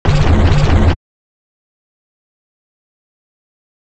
Instrument samples > Synths / Electronic
Deep Pads and Ambient Tones4
Analog, Chill, Synthesizer, synthetic, Tones, bassy, Haunting, Pads, Note, Synth, Ambient, bass, Oneshot, Pad, Dark, Digital, Ominous, Tone, Deep